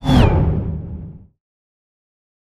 Sound effects > Other
Sound Design Elements Whoosh SFX 023
ambient, audio, cinematic, design, dynamic, effect, effects, element, elements, fast, film, fx, motion, movement, production, sound, sweeping, swoosh, trailer, transition, whoosh